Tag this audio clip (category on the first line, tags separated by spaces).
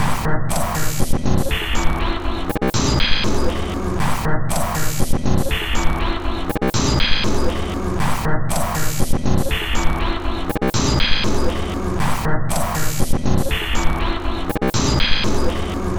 Instrument samples > Percussion
Samples Industrial Alien Loopable Dark Soundtrack Packs Ambient Weird Underground Loop Drum